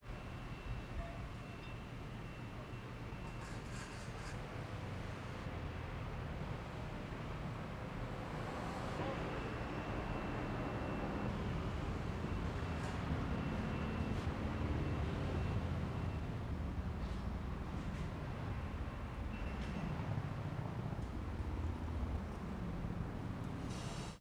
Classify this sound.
Sound effects > Human sounds and actions